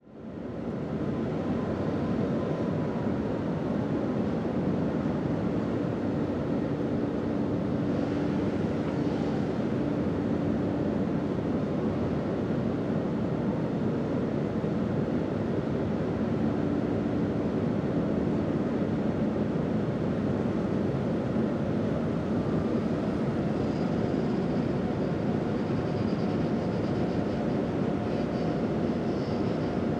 Soundscapes > Urban
St Nazaire-Industrial Bulk Port crane genereal
ambiance, boat, bulk, crane, dock, docks, engine, field-recording, harbour, industrial, industrie, port, Saint-Nazaire, ship, unload, work